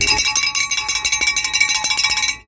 Music > Solo percussion
MUSCBell-Samsung Galaxy Smartphone, CU Cowbell, Small, Shake Nicholas Judy TDC
A small cowbell shake. Recorded at Goodwill.
cowbell, shake